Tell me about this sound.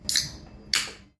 Sound effects > Objects / House appliances
OBJCont Soda Can, Open Nicholas Judy TDC
A soda can opening.
foley, soda, open, can